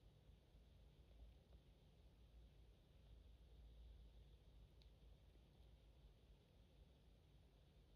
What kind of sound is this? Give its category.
Soundscapes > Indoors